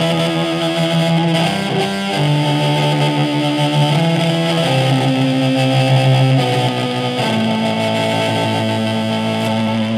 Music > Solo instrument
sYNTHETIC mELODY
I made this by playing a Casio CTK-150 then running it through a cheap Fender amp to my microphone. Then once I recorded it I ran some effects through it to eq and balance it out then BOOM. Cool sound :)